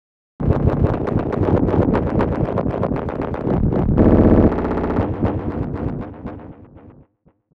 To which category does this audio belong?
Sound effects > Electronic / Design